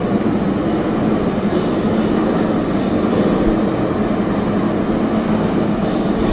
Soundscapes > Indoors
The sound of a train coming from a weird machine which was not a train... Sound recorded while visiting Biennale Exhibition in Venice in 2025 Audio Recorder: Zoom H1essential